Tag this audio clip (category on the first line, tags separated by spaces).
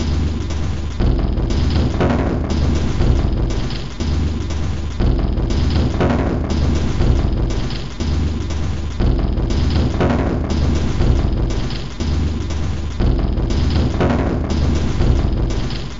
Instrument samples > Percussion
Alien Ambient Industrial Loop Loopable Packs Samples Soundtrack Underground Weird